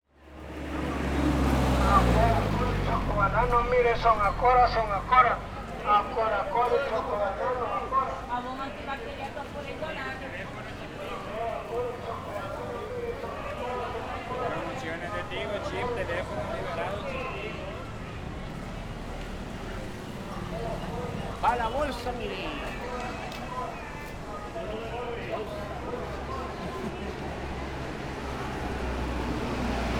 Speech > Conversation / Crowd
Vendedores mercado centro historico San Salvador
Vocal and ambient sound. Different street vendors offering chocobanano and other local products in the dissapeared market in the historic centre of San Salvador.
el, salvador, recording, ambient, san, field, central, street, america, vendors